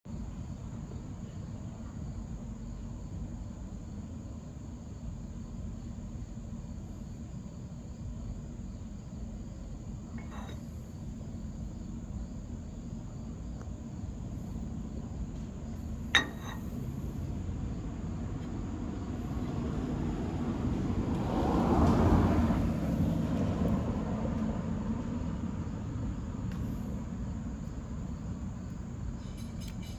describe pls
Soundscapes > Urban
Recorded outside on September 1st at Hyperion Coffee, Ypsilanti, MI. Recorded on iPhone 13 mini. A quiet Labor Day, the clinking of a glass or plate here and there.
coffee, holiday, quiet